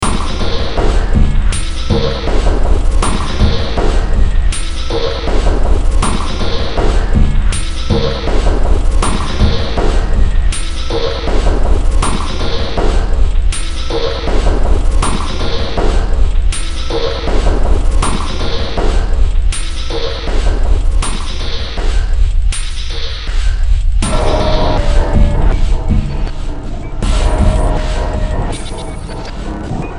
Music > Multiple instruments
Demo Track #3545 (Industraumatic)
Ambient, Cyberpunk, Games, Horror, Industrial, Noise, Sci-fi, Soundtrack, Underground